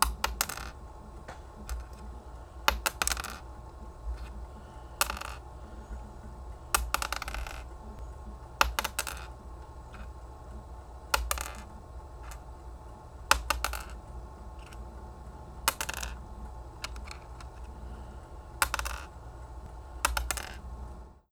Sound effects > Objects / House appliances
A jack being dropped.